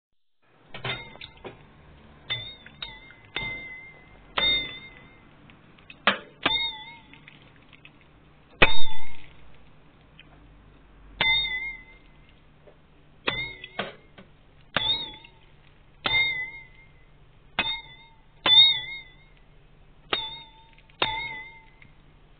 Sound effects > Objects / House appliances
Striking A Breakfast Bowl Filled With Water With a Metal Sieve